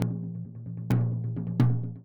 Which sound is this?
Music > Solo percussion
Med-low Tom - Oneshot 23 12 inch Sonor Force 3007 Maple Rack
acoustic, beat, drum, drumkit, drums, flam, kit, loop, maple, Medium-Tom, med-tom, oneshot, perc, percussion, quality, real, realdrum, recording, roll, Tom, tomdrum, toms, wood